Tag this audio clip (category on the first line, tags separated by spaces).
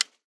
Sound effects > Human sounds and actions
activation interface click toggle button switch off